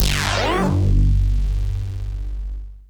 Instrument samples > Synths / Electronic
CVLT BASS 181
drops, synthbass, lowend, wobble, lfo, wavetable, stabs, bass, clear, subwoofer, synth, bassdrop, subbass, low, subs, sub